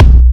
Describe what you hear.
Instrument samples > Percussion
A fatkick. It's not clicky with music.
attack, bass, bass-drum, bassdrum, beat, death-metal, drum, drums, fat-drum, fatdrum, fat-kick, fatkick, forcekick, groovy, headsound, headwave, hit, kick, mainkick, metal, natural, Pearl, percussion, percussive, pop, rhythm, rock, thrash, thrash-metal, trigger